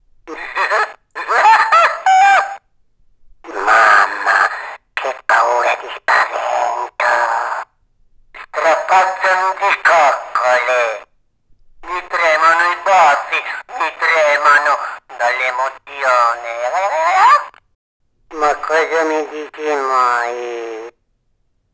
Speech > Solo speech
Topo Gigio - Peluche parlante anni '90
A classic italian vintage stuffed puppet talking pressing his left hand. Recorded in studio with a Behringer B-5 and Presonus Audiobox 22VSL
gigio, stuffed, puppet, peluche, pupazzo, topo